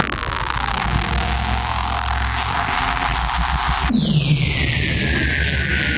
Sound effects > Electronic / Design
Optical Theremin 6 Osc ball infiltrated-002
Alien, Analog, Bass, Dub, Electro, Electronic, FX, Glitchy, Handmadeelectronic, Infiltrator, Instrument, noisey, Optical, Otherworldly, Robot, Robotic, Sci-fi, Scifi, SFX, Sweep, Trippy